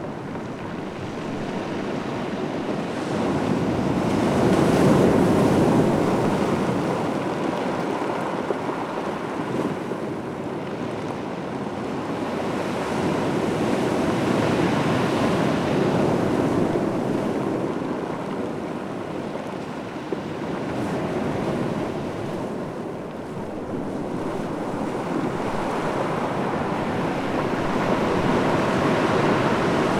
Soundscapes > Nature
Ocean Waves on Pebbly Beach - Iceland (loop)
Recorded at Djupalonssandur Beach in Iceland with my Tascam X6. I perched on some rocks by a tiny alcove with the waves rolling onto a shore of large black pebbles which gives the water a nice frothy sound when it recedes. Very light EQ applied. Loops seamlessly.
ambience, ambient, beach, breaking-waves, coast, crashing, Djupalonssandur, field-recording, frothy, iceland, loop, nature, ocean, pebble, pebbly, Portacapture, relaxing, rocky, sea, seashore, seaside, shore, snaefellsnes, surf, Tascam, water, wave, waves, windy, X6